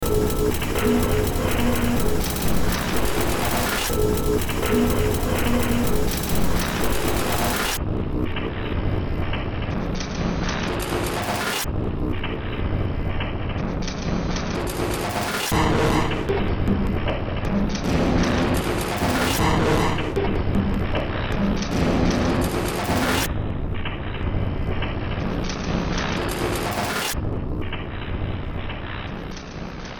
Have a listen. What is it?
Music > Multiple instruments
Demo Track #3505 (Industraumatic)
Ambient, Cyberpunk, Games, Horror, Industrial, Noise, Sci-fi, Soundtrack, Underground